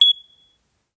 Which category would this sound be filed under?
Sound effects > Objects / House appliances